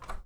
Sound effects > Objects / House appliances
A USB drive being ejected from a PC.